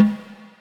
Music > Solo percussion
Snare Processed - Oneshot 179 - 14 by 6.5 inch Brass Ludwig
reverb, roll, snare, brass, flam, beat, acoustic, realdrum, sfx, hits, realdrums, drum, ludwig, snaredrum, snares, percussion, rim, oneshot